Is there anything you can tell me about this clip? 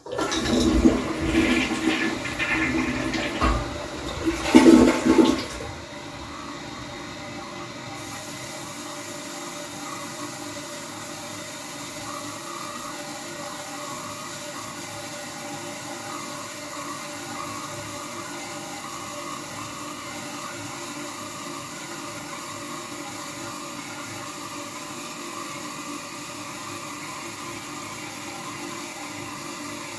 Sound effects > Objects / House appliances
WATRPlmb-Samsung Galaxy Smartphone, CU Toilet Flush, Air Tank Fill 02 Nicholas Judy TDC
A toilet flushing.
air-tank, fill, flush, Phone-recording, toilet